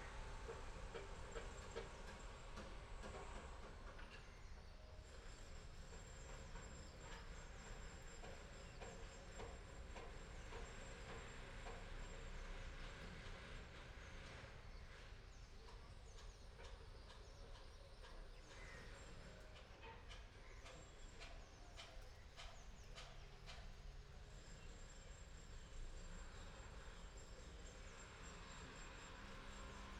Soundscapes > Urban
Versova Andheri Mumbai Construction Urban December 2025 2
Construction sounds of tall buildings captured among shorter buildings. Interesting decay of jackhammer hammer drill circular saw sounds. Captured in Inlaks, a housing society of old short 3 storey buildings set to be demolished. Part 2 of 2